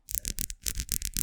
Sound effects > Human sounds and actions
Acrylic nails rubbing together. I recorded this on a zoom audio recorder.
acrylics, rub